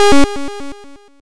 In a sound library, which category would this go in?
Sound effects > Electronic / Design